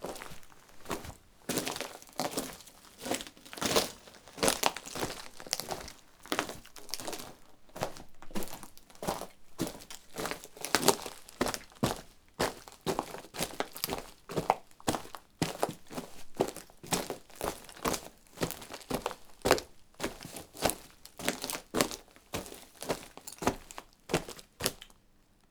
Sound effects > Human sounds and actions
Warlking on dry bark crunch (woody gravel) XY
Subject : Hand held recording of me walking on some tree bark and dusty ground in a barn where we keep the wood. Date YMD : 2025 04 22 Location : Gergueil Indoor, inside a barn where we keep the wood. Hardware : Tascam FR-AV2 Rode NT5 XY mode. Weather : Processing : Trimmed and Normalized in Audacity.
bark, barn, foot, foot-steps, footsteps, FR-AV2, indoors, NT5, Rode, step, Tascam, walk, Walking, wood, woody, woody-gravel, XY